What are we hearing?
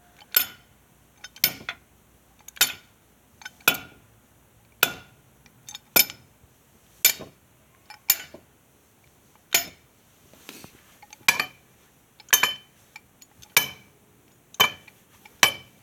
Sound effects > Objects / House appliances
wrench impacts
Hitting an object with a pipe wrench. Recorded with my phone.
clang, clank, impact, metal, metallic, pipe-wrench, tool, tools, wrench